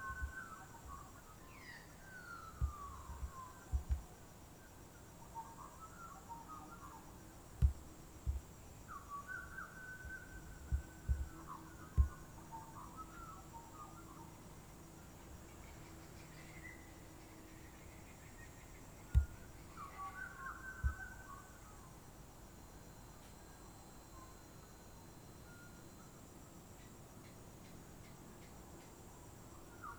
Soundscapes > Nature
Birdsong (train passes @ 2:45)
There are a few banging noises on the mic during the first minute, the rest is uninterrupted. Train passes around 2.45. Long and clear Kookaburra calls at 5.35. Recorded in a suburban backyard in Brisbane, south east Queensland, Australia.
birds, birdsong, brisbane, field-recording, nature, suburban